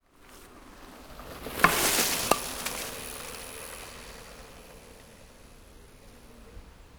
Sound effects > Animals
Bike riding in the forest
bicyc, forest, bike, stereo